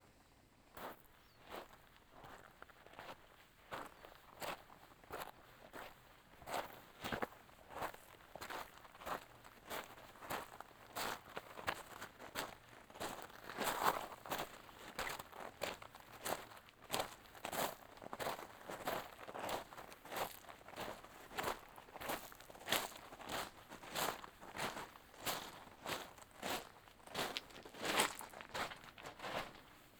Sound effects > Human sounds and actions
Footsteps - PebbleBeach - Tottori Japan - Binaural
Me walking on an pebble beach in Tottori, Japan.
beach, field-recording, binaural, walk, japan